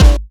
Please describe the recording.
Instrument samples > Percussion
snarefake 6x13 inches DW Edge
The snare 6×13" (inches) DW Edge mixed with a pitch-shifting kick boosted at the 57 ㎐ and also at a wide mid-low range. I don't like it. It's not clear/ it's not a maximally distinct mainsnare. It can be used as a secondary snare.